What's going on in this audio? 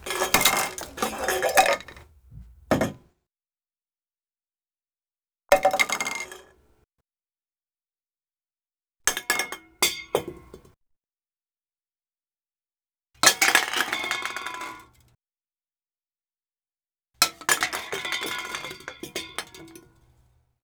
Sound effects > Objects / House appliances
Several takes of cans being knocked over / falling.